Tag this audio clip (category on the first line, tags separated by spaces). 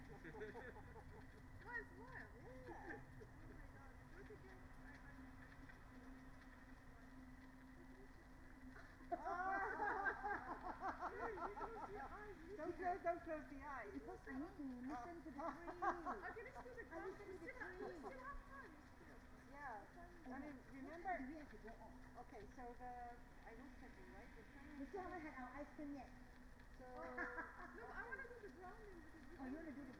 Soundscapes > Nature
data-to-sound modified-soundscape natural-soundscape nature phenological-recording